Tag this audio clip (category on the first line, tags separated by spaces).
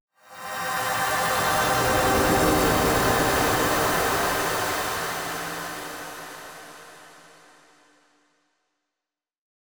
Sound effects > Electronic / Design
magic sweeping shimmering slow reverse